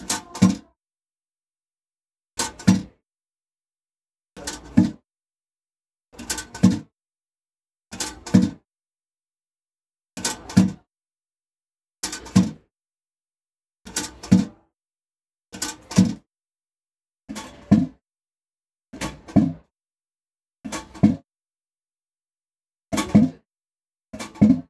Sound effects > Objects / House appliances
A toilet flush. Lever only. Recorded at Lowe's.